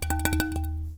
Music > Solo instrument
Marimba Loose Keys Notes Tones and Vibrations 40-001
tink, foley, oneshotes, rustle, keys, thud, block, marimba, woodblock, wood, perc, percussion